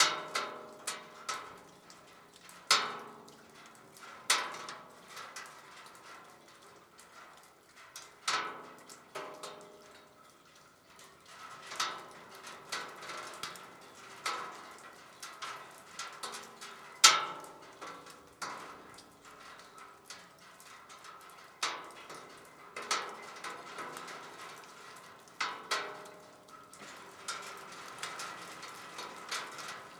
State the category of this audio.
Soundscapes > Urban